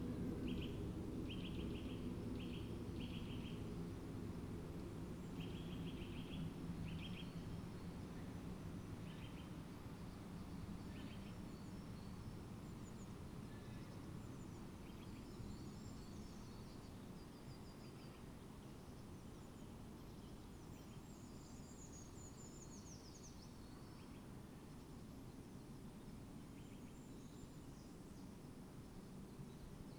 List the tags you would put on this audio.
Soundscapes > Nature
sound-installation weather-data field-recording phenological-recording raspberry-pi nature modified-soundscape artistic-intervention natural-soundscape alice-holt-forest Dendrophone soundscape data-to-sound

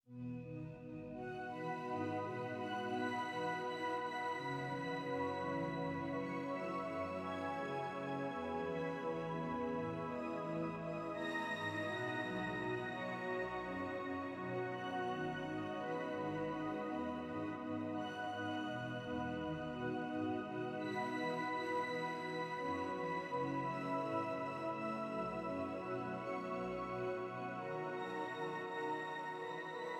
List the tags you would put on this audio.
Music > Solo instrument
sci-fi atmosphere ambience meditation electronic synth ambient soundscape calm music

